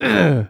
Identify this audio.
Speech > Other
Young Man Hurt Voice
The sound of someone being hurt. Male vocal recorded using Shure SM7B → Triton FetHead → UR22C → Audacity → RX → Audacity.
disgust, disgusting, emotion, emotional, eww, male, pain, scream, screaming, voice, yuck